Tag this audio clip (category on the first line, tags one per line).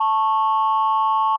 Instrument samples > Synths / Electronic
Synth
Landline-Phone
JI
Landline-Holding-Tone
just-minor-3rd
Landline-Telephone-like-Sound
JI-3rd
just-minor-third
Landline-Telephone
JI-Third
Tone-Plus-386c
Old-School-Telephone
Holding-Tone
Landline-Phonelike-Synth
Landline